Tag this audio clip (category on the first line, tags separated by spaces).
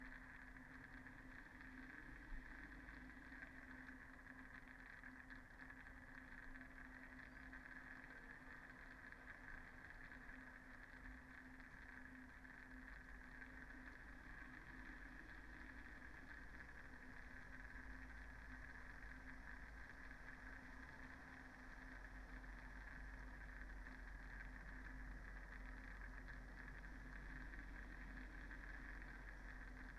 Soundscapes > Nature
phenological-recording field-recording data-to-sound soundscape alice-holt-forest Dendrophone modified-soundscape sound-installation artistic-intervention nature natural-soundscape raspberry-pi weather-data